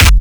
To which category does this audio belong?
Instrument samples > Percussion